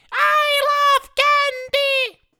Speech > Solo speech
i love candy puppet
calm, candy, cute, puppet